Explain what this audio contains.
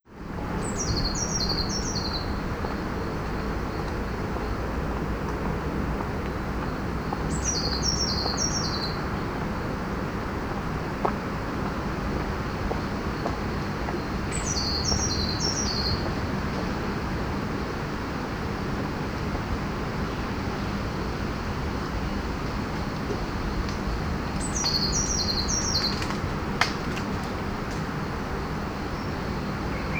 Soundscapes > Nature
040 BOTANICO AMBIENT BIRDS CITY 2
city, ambient, birds